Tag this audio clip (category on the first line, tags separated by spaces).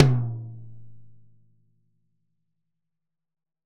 Music > Solo percussion
kit oneshot perc percussion quality roll